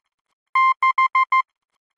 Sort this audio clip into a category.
Sound effects > Electronic / Design